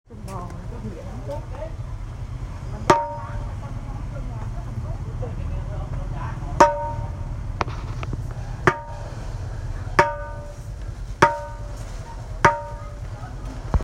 Sound effects > Objects / House appliances
Bún Thùng Bia - Hit Beer Box
Hit beer box. Record use iPhone 7 Plus smart phone. 2025.11.23 16:09
beer, can, hit